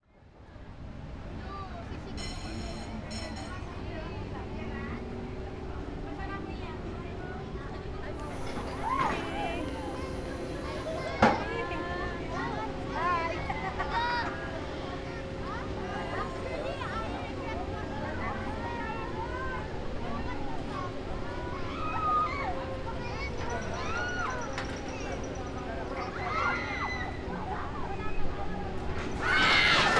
Soundscapes > Other
250805 194455 Kids enjoying the Drop Tower
Kids enjoying the drop tower. Recorded in August 2025, at the outdoor area of Mall Of Asia (manila, Philippines), with a Zoom H5studio (built-in XY microphones). Fade in/out applied in Audacity.
ambience, amusement, atmosphere, children, drop-tower, fairground, field-recording, fun, funfair, funny, kids, laugh, laughing, Manila, Philippines, scream, screaming, shout, shouting, soundscape, teen-agers, yell, yelling